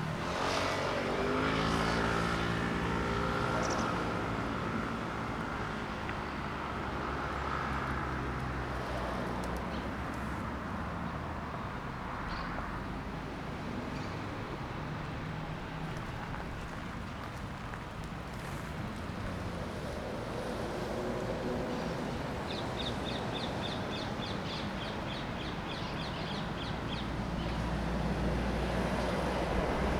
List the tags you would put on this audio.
Soundscapes > Urban
Birds Cars Nature

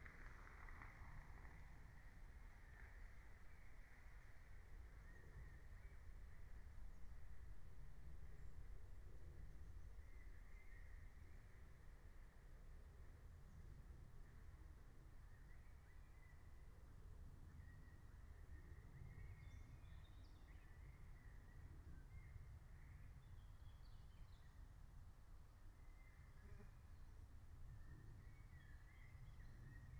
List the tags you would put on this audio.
Soundscapes > Nature
nature natural-soundscape phenological-recording raspberry-pi alice-holt-forest field-recording meadow soundscape

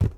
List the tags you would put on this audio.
Sound effects > Objects / House appliances
bucket debris foley container object lid liquid slam handle shake drop clatter knock carry plastic fill cleaning pail pour tip spill tool clang scoop garden water hollow household metal kitchen

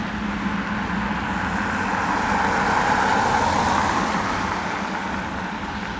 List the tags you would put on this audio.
Soundscapes > Urban
Car Drive-by field-recording

